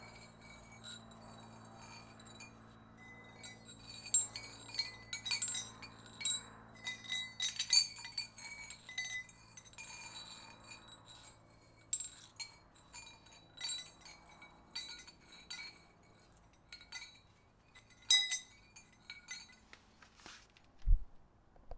Soundscapes > Urban
Small metal tubes shuffled around on a concrete floor. Recorded with a Zoom h1n.